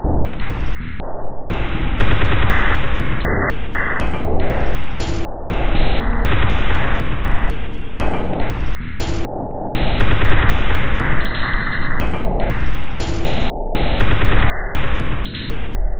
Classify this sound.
Instrument samples > Percussion